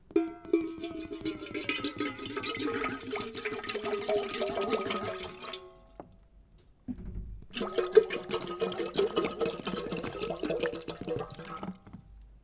Sound effects > Objects / House appliances
pouring water from plastic bottle in metal container
Pouring down a lot of water from a plastic PET bottle into an old metal kettle. Recorded with Zoom H2.
metal, container, water, pouring, liquid